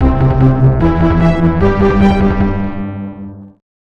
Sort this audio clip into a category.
Music > Multiple instruments